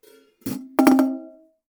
Music > Solo instrument
Vintage Custom 14 inch Hi Hat-022
Hats, Drum, HiHat, Metal, Hat, Oneshot, Perc, Kit, Vintage, Cymbals, Cymbal, Drums, Percussion, Custom